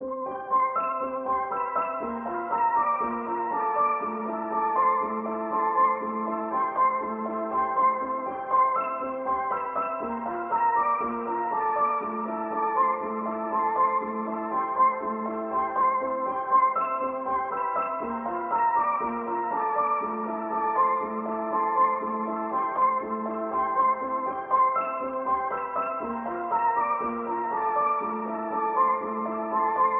Music > Solo instrument
Piano loops 196 efect 2 octave long loop 120 bpm
120, 120bpm, free, loop, music, piano, pianomusic, reverb, samples, simple, simplesamples